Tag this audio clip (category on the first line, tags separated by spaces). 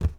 Sound effects > Objects / House appliances
bucket clang clatter cleaning container drop fill foley garden handle hollow household kitchen lid liquid metal object pail plastic pour scoop shake slam spill tip tool water